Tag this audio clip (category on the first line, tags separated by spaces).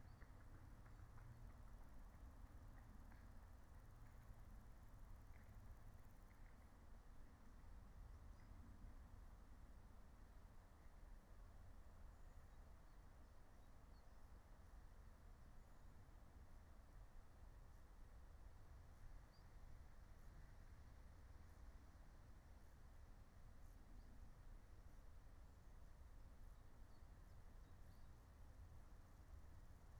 Soundscapes > Nature
nature field-recording natural-soundscape alice-holt-forest raspberry-pi soundscape phenological-recording meadow